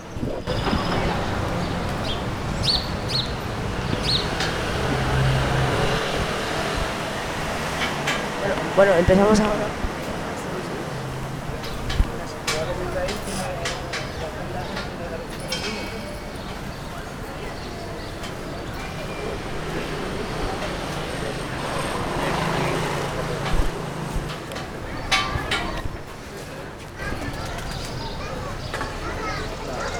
Soundscapes > Urban
20251114 PlacaAllende Birds Cars Humans Complex
Urban Ambience Recording in collab with Coves del Cimany High School, Barcelona, November 2026. Using a Zoom H-1 Recorder.
Birds, Cars